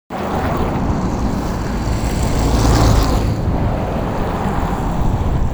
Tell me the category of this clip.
Sound effects > Vehicles